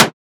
Sound effects > Vehicles
Something Hits Car Body(White Noise Synthed)
Only synthed with whithnoise My synthsiser only used 3Xosc Do somany Softclip,and Dynamic EQ with it And Used Transient Shaper Of KHS
Car, foley, hit